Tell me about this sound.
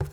Sound effects > Objects / House appliances
A bucket being hit in vatious ways recorded by a usb mic trimmed short for possible imapact layering/ foley uses and raw. Visit my links for more Sound packs.
carry, shake, knock, spill, pour, plastic, handle, water, hollow, tool, pail, clang, container, lid, garden, tip, foley, cleaning, fill, metal, debris, liquid, kitchen, drop, object, scoop, clatter, bucket, household, slam